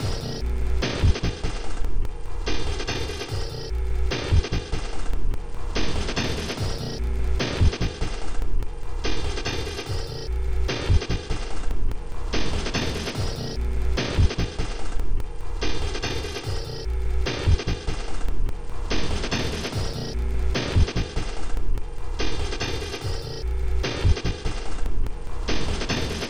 Instrument samples > Percussion
This 73bpm Drum Loop is good for composing Industrial/Electronic/Ambient songs or using as soundtrack to a sci-fi/suspense/horror indie game or short film.
Packs, Alien, Loop, Weird, Soundtrack, Underground, Loopable, Ambient, Drum, Samples, Industrial, Dark